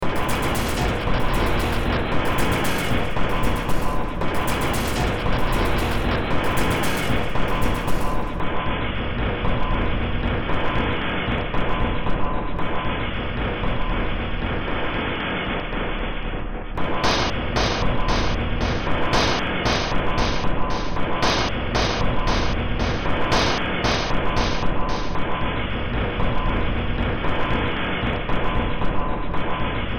Music > Multiple instruments
Demo Track #3967 (Industraumatic)
Industrial, Games, Ambient, Sci-fi, Noise, Underground, Cyberpunk, Horror, Soundtrack